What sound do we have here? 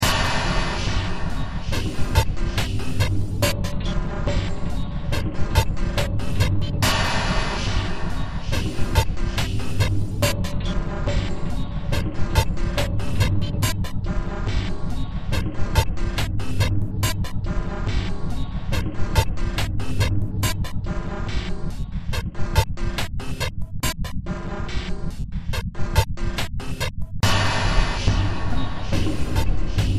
Music > Multiple instruments
Demo Track #3793 (Industraumatic)
Cyberpunk, Games, Horror, Industrial, Noise, Soundtrack, Underground